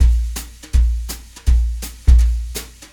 Music > Solo percussion
Jazz 80 bpm
Brushes sample at 63 bpm , jazzy vibe
drum-loop, jazz, loop